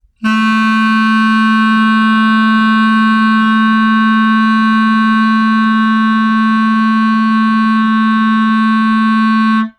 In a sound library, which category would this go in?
Instrument samples > Wind